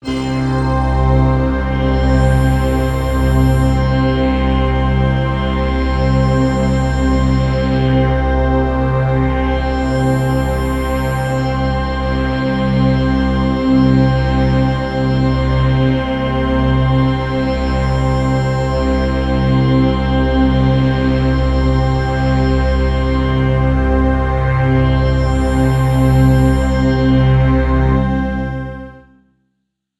Instrument samples > Synths / Electronic
Synth Ambient Pad note C4 #009
one-shot, C4, ambient, synth, cinematic, pad, space-pad